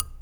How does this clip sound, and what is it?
Sound effects > Objects / House appliances
knife and metal beam vibrations clicks dings and sfx-120
Beam, Clang, ding, Foley, FX, Klang, Metal, metallic, Perc, SFX, ting, Trippy, Vibrate, Vibration, Wobble